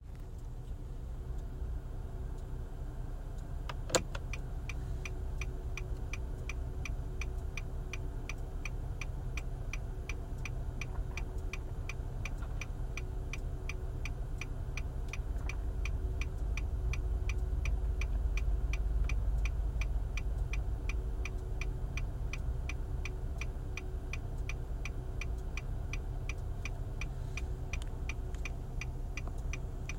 Sound effects > Vehicles
Driving down the road and needing to switch lanes.